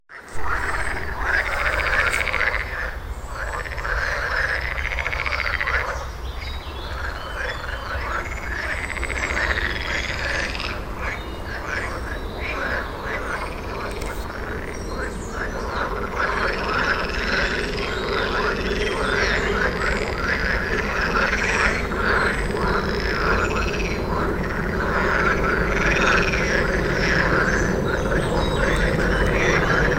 Soundscapes > Nature
Frog concert
Frogs croaking in a pond with some fx to exaggerate a little
frogs, pond